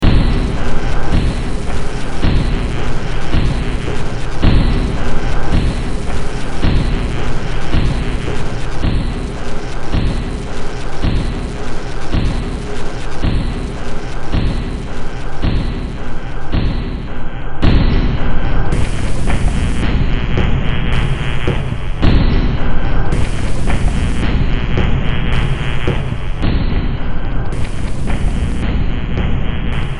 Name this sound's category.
Music > Multiple instruments